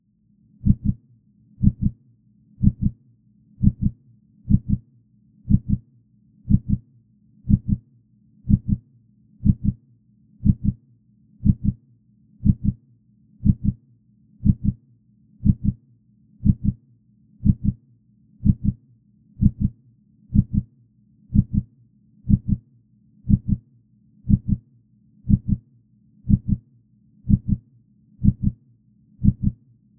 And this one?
Sound effects > Human sounds and actions
Processed Heartbeat
A more "Hollywood" version of my heartbeat recording, processed to sound more like what a layman might expect a heartbeat to sound like.